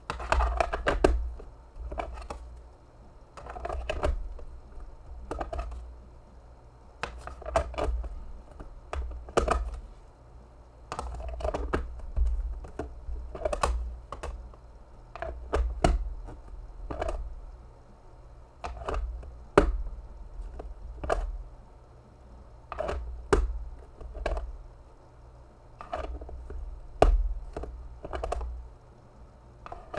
Sound effects > Objects / House appliances
PLASHndl-Blue Snowball Microphone Sandwich Box, Open, Close Nicholas Judy TDC
A sandwich box opening and closing.
Blue-brand, Blue-Snowball, close, foley, open, sandwich-box